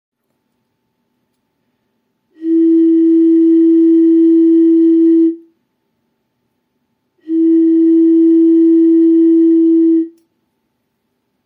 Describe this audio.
Instrument samples > Other
A few notes produced by blowing on a half-empty bottle.